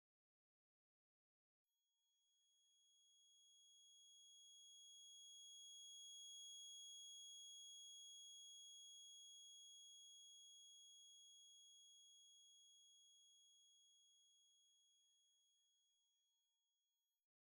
Sound effects > Electronic / Design
A high-pitched squeaking sound, resembling tinnitus effect, created using a synthesizer.
earring, short